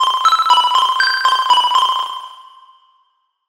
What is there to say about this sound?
Sound effects > Electronic / Design
DREAM COMPLEX TWO
A short, frantic digital burst. It consists of rapid, high-pitched staccato bleeps that sound glitchy and abrasive, resembling a high-speed computer data transmission or a sci-fi error alert.
digital, electronic, fx, glitch, sound-design, sound-effect, synthetic